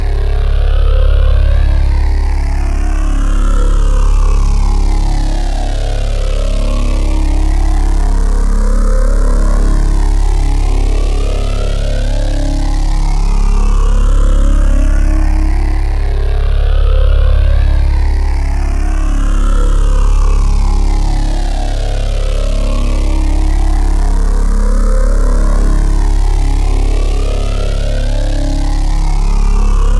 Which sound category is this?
Sound effects > Experimental